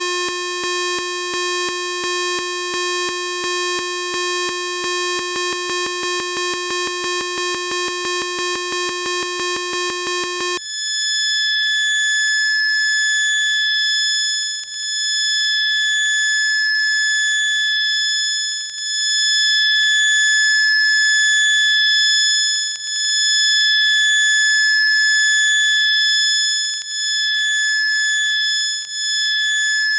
Sound effects > Objects / House appliances
Electromagnetic Field Recording of Christmas Tree LED #002 Long
Electromagnetic field recording of a Christmas Tree LED (Light Emitting Diode). This is a longer recording capturing the whole lighting sequence. Electromagnetic Field Capture: Electrovision Telephone Pickup Coil AR71814 Audio Recorder: Zoom H1essential
christmas, coil, electric, electrical, electromagnetic, field, field-recording, LED, light, Light-Emitting-Diode, lighting, magnetic